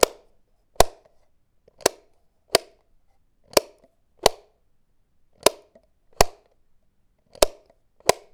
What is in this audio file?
Sound effects > Objects / House appliances
SFX Indoor HeavyLightSwitchOnOff
field-recording, heavy, indoor, light-switch, mechanical, subtle